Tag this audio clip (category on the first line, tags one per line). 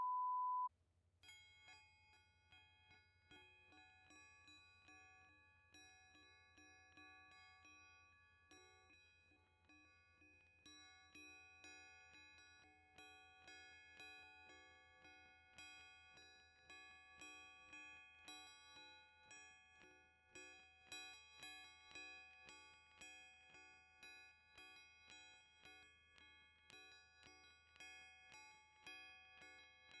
Sound effects > Experimental
gong
clang
steel
iron
metallic
reverb
shiny
metal
glitch